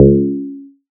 Instrument samples > Synths / Electronic
FATPLUCK 1 Db
fm-synthesis, bass